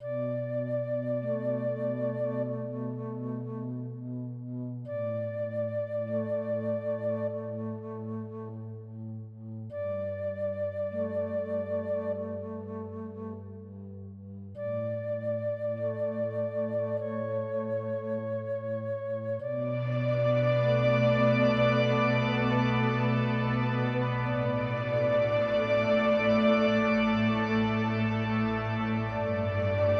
Music > Multiple instruments
An emotional piece of music suitable as background for conveying deep or touching moments in various situations. Technical details: DAW: FL Studio VSTs: reFX Nexus Instruments: Violins BPM: 90 Pre-made loops: None used AI content: None (composed entirely from scratch)